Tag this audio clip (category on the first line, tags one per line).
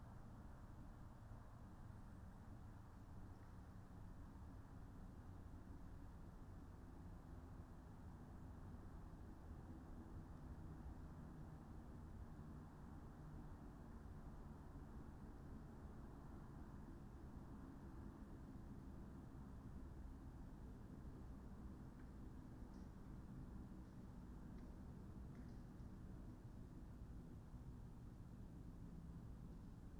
Soundscapes > Nature
sound-installation
raspberry-pi
modified-soundscape
nature
data-to-sound
weather-data
natural-soundscape
artistic-intervention
phenological-recording
alice-holt-forest
field-recording
soundscape
Dendrophone